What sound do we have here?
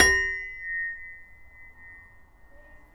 Sound effects > Other mechanisms, engines, machines
metal shop foley -057
oneshot, rustle, metal, bam, sfx, little, pop, foley, percussion, bop, boom, crackle, sound, tools, thud, bang, fx, tink, shop, strike, perc, knock, wood